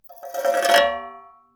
Sound effects > Other mechanisms, engines, machines
Dewalt 12 inch Chop Saw foley-030
Blade, Chopsaw, Circularsaw, Foley, FX, Metal, Metallic, Perc, Percussion, Saw, Scrape, SFX, Shop, Teeth, Tool, Tools, Tooth, Woodshop, Workshop